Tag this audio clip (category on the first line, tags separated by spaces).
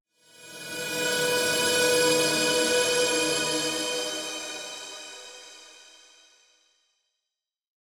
Sound effects > Electronic / Design
bright high-pitched magic reverse shimmering slow